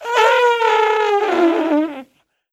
Sound effects > Animals
ANMLWild-Samsung Galaxy Smartphone, CU Elephant Trumpet, Human Imitation, Sounds Realistic Nicholas Judy TDC
An elephant trumpeting. Human imitation. Sounds realistic.